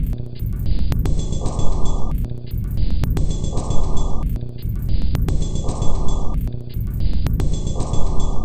Instrument samples > Percussion
This 227bpm Drum Loop is good for composing Industrial/Electronic/Ambient songs or using as soundtrack to a sci-fi/suspense/horror indie game or short film.
Dark, Drum, Loopable, Packs, Samples, Weird